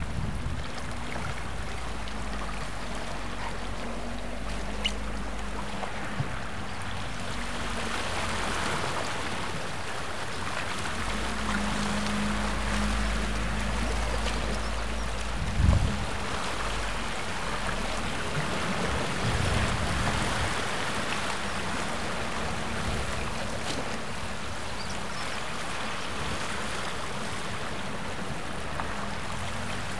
Soundscapes > Nature
Sea in Ushant
The calm waves of YUZIN beach in Ushant. Recorded with a Zoom H4N Pro